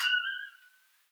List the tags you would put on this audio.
Instrument samples > Percussion
flexatone
junkka
sauna
perc
dnb
jungle
water

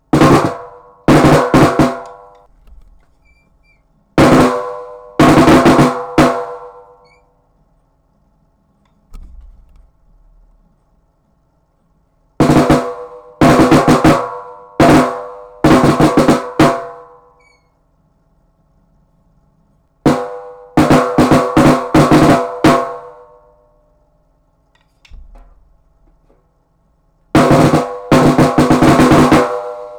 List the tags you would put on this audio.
Music > Solo percussion
Blue-brand
Blue-Snowball
drum
figure
snare
snare-drum

